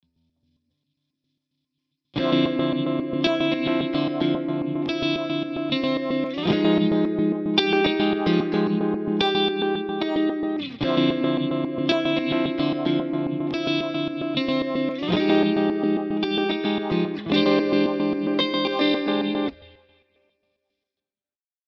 Music > Solo instrument
Guitar Loop In D Minor (111 BPM)
Guitar, Plucked, Strings